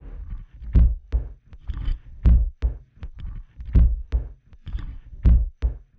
Music > Solo percussion
guitar percussion loop
acoustic, guitar, techno